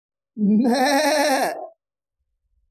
Sound effects > Animals
Mimicking the sound of sheep by a human being. Bleating.
bleat, Farming, farm, sheep, animal, bleating, meat, countryside, cattle, rural, nature, lambs, farmer, sheepdog, domestic, Herd